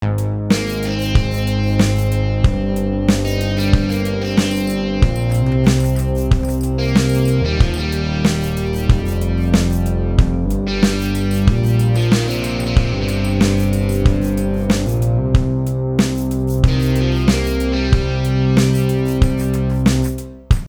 Music > Multiple instruments
4/4 time signature, in C major, 88 bpm